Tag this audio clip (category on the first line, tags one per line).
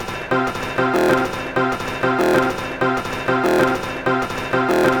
Instrument samples > Percussion
Samples
Ambient
Weird
Alien
Underground
Dark
Packs
Soundtrack
Industrial
Loopable
Drum
Loop